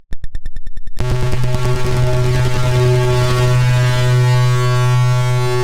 Sound effects > Electronic / Design
Optical Theremin 6 Osc ball delay-003
Trippy, Glitchy, FX, Synth, Theremins, Dub, Sweep, Digital, Experimental, noisey, Otherworldly, Glitch, Electronic, Scifi, DIY, Bass, Noise, Robot, Sci-fi, Infiltrator, Analog, Robotic, Optical, Theremin, Spacey, Handmadeelectronic, Instrument, Electro, Alien, SFX